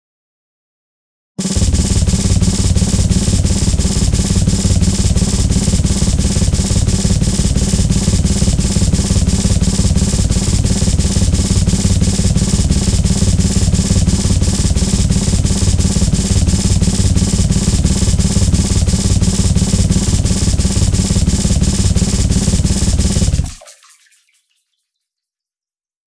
Music > Solo percussion
Simple Bass Drum and Snare Pattern with Weirdness Added 037
Bass-and-Snare, Bass-Drum, Experimental, Experimental-Production, Experiments-on-Drum-Beats, Experiments-on-Drum-Patterns, Four-Over-Four-Pattern, Fun, FX-Drum, FX-Drum-Pattern, FX-Drums, FX-Laden, FX-Laden-Simple-Drum-Pattern, Glitchy, Interesting-Results, Noisy, Silly, Simple-Drum-Pattern, Snare-Drum